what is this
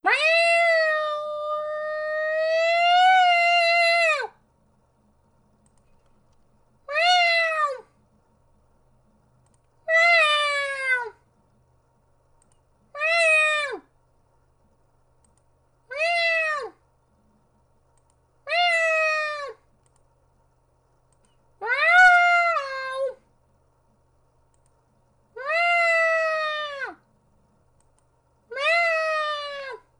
Sound effects > Animals
TOONAnml-MCU Cat, Scared, Human Imitation Nicholas Judy TDC
A scared cat. Human imitation.
cat, Blue-Snowball, Blue-brand, imitation, scared, human, cartoon